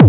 Instrument samples > Percussion
Synthed with phaseplant only. Processed with: Waveshaper, ZL EQ, Khs Distortion.